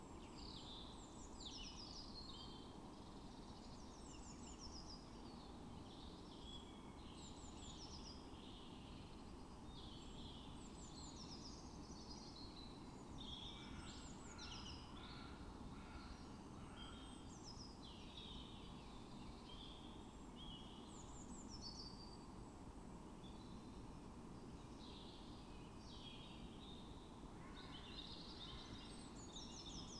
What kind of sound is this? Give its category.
Soundscapes > Nature